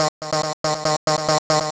Music > Other
Random BrazilFunk Vocalchop 2
Acapella, BrazilFunk, BrazilianFunk, EDM, vocal, VocalChop